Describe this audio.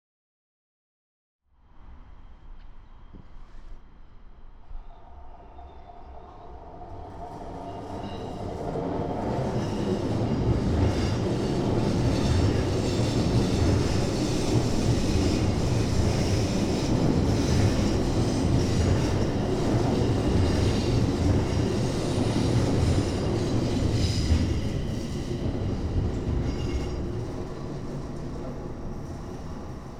Soundscapes > Urban
Subway Train Passing
Sound of the tunnelbana (local subway) passing twice up close, at about 10m away and then a tramway passing in between further away. Distant highway in the background. Recorded in 6 channels surround, L, R, Ls, Rs, Lt and Rt. The uploaded file has10 channels to easily fit into a Dolby Atmos bed track in the following channel layout: L, R, C, LFE, Ls, Rs, Lr, Rr, Lt, Rt. The C, LFE, Lr and Rr are left empty. Try panning the surrounds into the rear channels to get a more immersive sound.